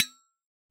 Sound effects > Objects / House appliances
Solid coffee thermos-009
Here you have a pack of *coffee thermos being hit* samples, some with its own lid and some others with a coffee cup, you have to find out which one you're listening.
percusive, sampling